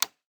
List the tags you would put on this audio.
Sound effects > Human sounds and actions
toggle off interface activation button switch click

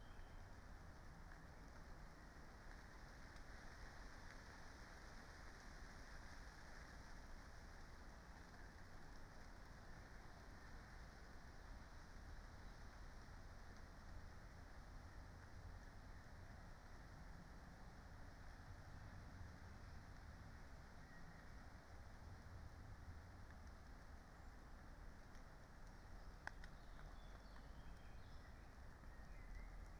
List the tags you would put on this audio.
Soundscapes > Nature
modified-soundscape phenological-recording soundscape nature

